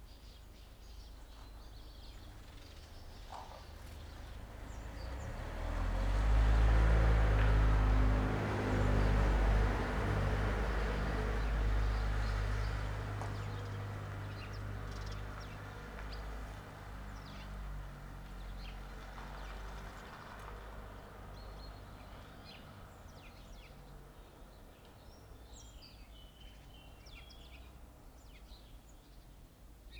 Sound effects > Vehicles
250427-15h36 Gergueil - Car in village
Subject : A car passing though the narrow streets of a village. Date YMD : 2025 04 27 15h36 Location : Gergueil France. Hardware : Zoom H5 stock XY capsule. Weather : Processing : Trimmed and Normalized in Audacity.
2025, car, H5, XY, Gergueil, 04, motor, Zoom, April, engine, Vehicle, Spring